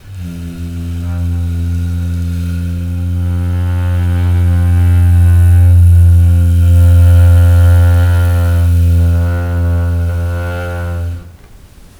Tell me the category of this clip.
Sound effects > Objects / House appliances